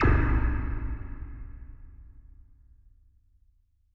Sound effects > Electronic / Design
003 LOW IMPACT
BACKGROUND, BASSY, BOOMY, DEEP, HIT, HITS, IMPACT, IMPACTS, LOW, PUNCH, RATTLING, RUMBLE, RUMBLING